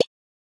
Sound effects > Objects / House appliances
Masonjar Shake 5 Perc
Shaking a 500ml glass mason jar half filled with water, recorded with an AKG C414 XLII microphone.
mason-jar, water, shake